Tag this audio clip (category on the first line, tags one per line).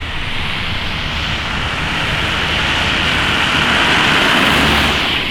Sound effects > Vehicles
automobile
field-recording
rainy
vehicle
car
drive